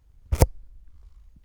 Sound effects > Other
Cat scratching foam
A cat "grabbing" my MKE600's foam windcover. Tascam FR-AV2, Sennheiser MKE600 Cat's name is Pearl. Recorded indoors in France. 2025 07 23
MKE-600, Tascam